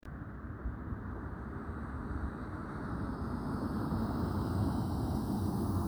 Sound effects > Vehicles
A car passing by in Hervanta, Tampere. Recorded with Samsung phone.
car, engine, vehicle